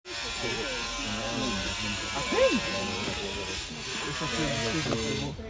Sound effects > Other mechanisms, engines, machines
House work noises
Work noise on a house in Calanques National Park.